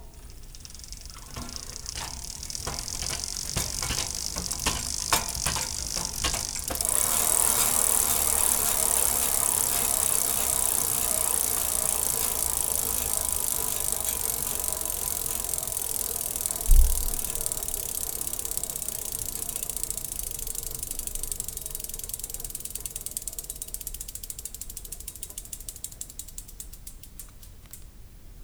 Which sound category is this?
Sound effects > Other mechanisms, engines, machines